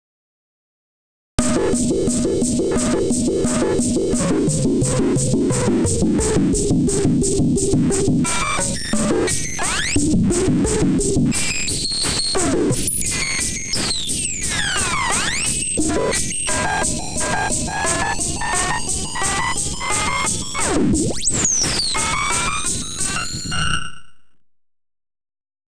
Music > Solo percussion
Simple Bass Drum and Snare Pattern with Weirdness Added 051
Experimental-Production, Interesting-Results, Bass-and-Snare, Snare-Drum, Bass-Drum, FX-Drums, Simple-Drum-Pattern, FX-Laden, FX-Drum-Pattern, Glitchy, Experiments-on-Drum-Patterns, Noisy, Four-Over-Four-Pattern, Experiments-on-Drum-Beats, Silly, Experimental, Fun, FX-Drum, FX-Laden-Simple-Drum-Pattern